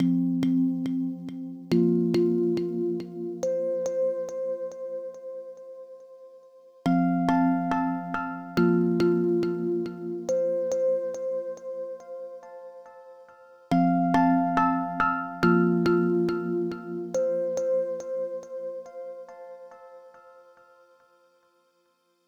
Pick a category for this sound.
Music > Solo instrument